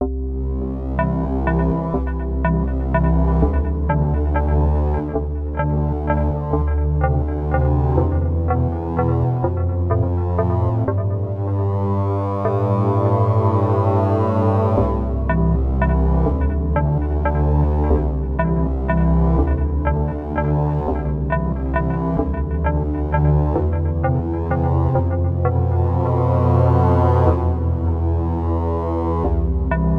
Soundscapes > Synthetic / Artificial
Melody from the legends at the mountains
This melody was inspired by the legends of the Bolivian mountains, a magical place full of mystical stories and characters that deeply inspire me, now that is winter it fits perfect with that cold aesthetic.
ancient, legends, melody, mystical